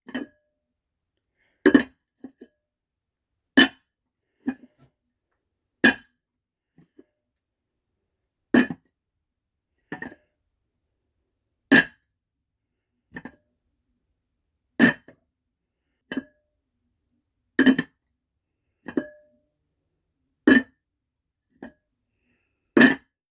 Sound effects > Objects / House appliances
A cookie jar opening and closing.